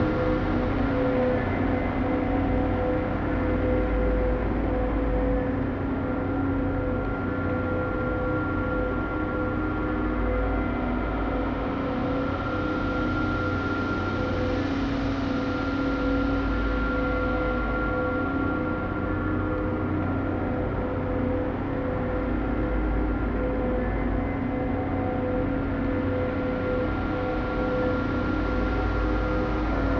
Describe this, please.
Soundscapes > Synthetic / Artificial
An experimental, looped texture designed in Reason Studios.
atmospheric, evolving, eerie, dark, loped, distorted, synthetic